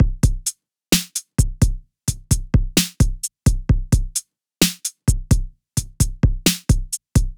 Instrument samples > Percussion
drum machine-esque compressed drum loop (130bpm)
All the free wavs in this pack are Ableton drum racks that I've cut into loops and exported from old beats that never left my computer. They were arranged years ago in my late teens, when I first switched to Ableton to make hip-hop/trap and didn't know what I was doing. They are either unmixed or too mixed with reverb built in. Maybe I'm being too harsh on them. I recommend 'amen breaking' them and turning them into something else, tearing them apart for a grungy mix or layering to inspire pattern ideas. That's what I love doing with them myself.
130bpm, drum, drums, loop, machine, sample